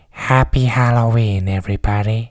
Speech > Solo speech
happy halloween everybody, calm voice
calm, horror, male, halloween, voice